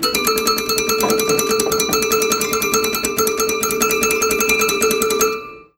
Music > Solo instrument
MUSCToy-Samsung Galaxy Smartphone, CU Piano, Trill Nicholas Judy TDC
A toy piano trill. Recorded at Goodwill.